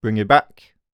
Speech > Solo speech
Bring it back
Subject : I was looking for a few "Dj chants" / vocals to hype up songs. Frankly I'm not a very good voice actor, not a hype person in general so these get out of my personality, therefore it was challenging and a little cringe as you can hear lol. Weather : Processing : Trimmed and Normalized in Audacity, Faded in/out. Notes : I think there’s a “gate” like effect, which comes directly from the microphone. Things seem to “pop” in. Tips : Check out the pack!